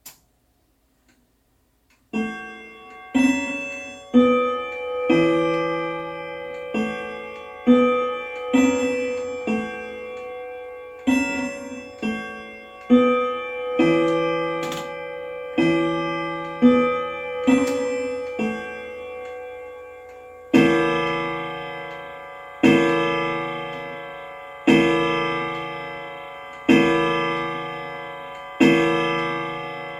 Sound effects > Other mechanisms, engines, machines
CLOCKChim-Samsung Galaxy Smartphone, CU Grandfather Clock Chimes, Strikes 12 O' Clock Nicholas Judy TDC
A grandfather clock chiming and striking 12 o' clock.
12, chime, clock, grandfather, grandfather-clock, Phone-recording, strike, westminster